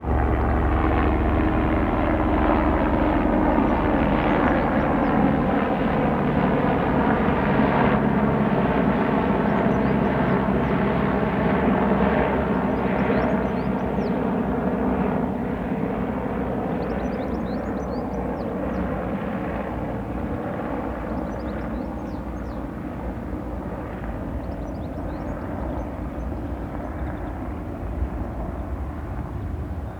Soundscapes > Urban
Splott - Helicopter Flyby Birds Rumbles - Splott Beach
wales, splott, fieldrecording